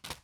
Sound effects > Objects / House appliances
Sound used originally for the action of putting something in a paper bag. Recorded on a Zoom H1n & Edited on Logic Pro.
PaperBag, Crunch